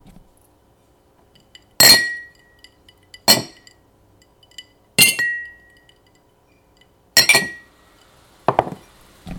Sound effects > Objects / House appliances
Glasses clink
Glasses with ice water, clinking, set down.
clink, glass, glasses, cheers, toast